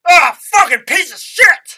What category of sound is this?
Speech > Solo speech